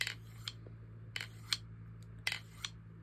Sound effects > Other
my brother scraping drumsticks. could be used for a short marble bounce
marbles, pebbles, scrape, scraping, stone